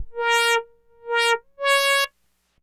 Sound effects > Experimental
Analog Bass, Sweeps, and FX-148
robot, mechanical, snythesizer, korg, sweep, basses, analog, synth, pad, weird, electronic, complex, retro, scifi, dark, sample, sci-fi, sfx, electro, effect, oneshot, analogue, vintage, fx, robotic, alien, trippy, machine, bassy, bass